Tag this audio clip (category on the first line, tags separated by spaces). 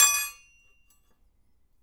Sound effects > Other mechanisms, engines, machines
little bop tools bang